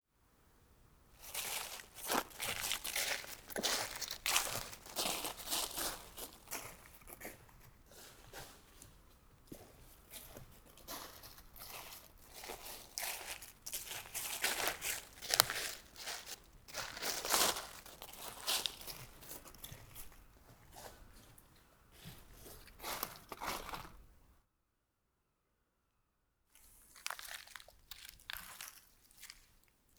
Sound effects > Human sounds and actions
Walking slowly in the forest Location: Poland Time: November 2025 Recorder: Zoom H6 - XYH-6 Mic Capsule
slow, walking, rustle, leaves, stereo, forest